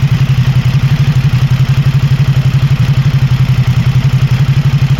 Sound effects > Other mechanisms, engines, machines
puhelin clip prätkä (5)
Motorcycle, Ducati, Supersport